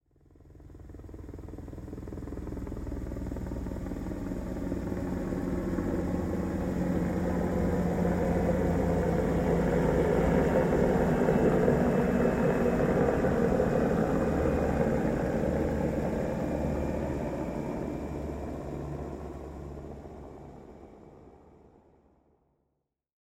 Sound effects > Vehicles
A helicopter flying by fast.